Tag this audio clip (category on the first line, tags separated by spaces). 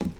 Sound effects > Objects / House appliances
clang shake carry pail drop water metal cleaning bucket garden liquid pour slam lid handle object tool foley plastic kitchen scoop household hollow knock clatter fill debris